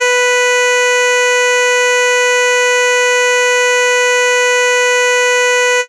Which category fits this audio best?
Instrument samples > Synths / Electronic